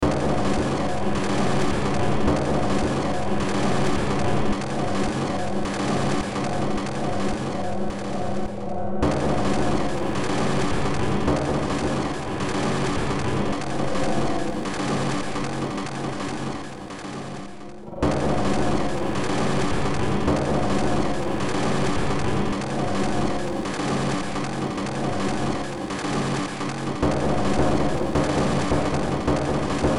Music > Multiple instruments
Short Track #4062 (Industraumatic)
Games, Ambient, Noise, Cyberpunk, Soundtrack, Underground, Sci-fi, Horror, Industrial